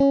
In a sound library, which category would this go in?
Instrument samples > String